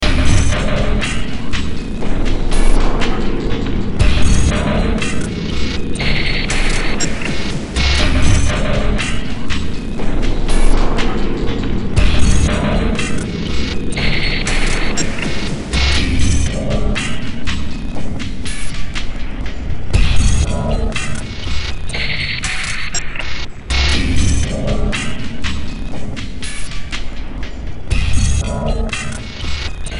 Music > Multiple instruments
Demo Track #3818 (Industraumatic)
Cyberpunk, Games, Sci-fi, Industrial, Soundtrack, Ambient, Noise, Horror, Underground